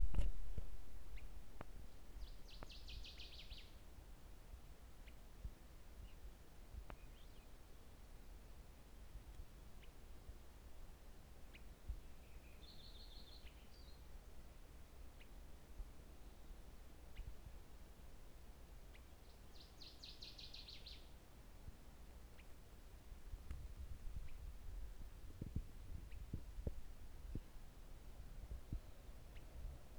Soundscapes > Nature
Birdsong at Lake Clark National Park
Alaska, Bird, Thursh